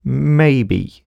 Speech > Solo speech
Doubt - Mmaybe
FR-AV2, talk, Tascam, Human, voice, NPC, skeptic, maybe, oneshot, Man, dialogue, Neumann, Video-game, Male, word, doubt, skepticism, U67, Voice-acting, Single-take, Vocal, singletake, Mid-20s